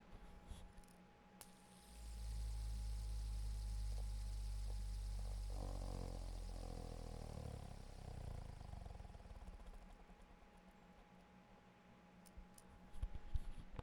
Sound effects > Objects / House appliances
Recorded using a Zoom H1N, using a fidget spinner close to a compressor mic, picking up darker frequencies.
whirl, fidget, spin